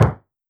Sound effects > Human sounds and actions
LoFiFootstep Gravel Walking-04
footstep, footsteps, gravel, lofi, running, steps, synth, walk, walking